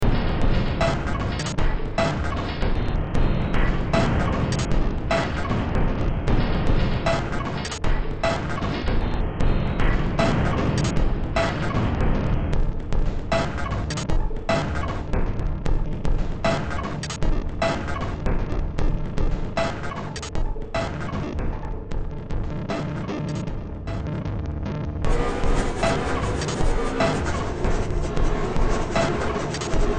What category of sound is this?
Music > Multiple instruments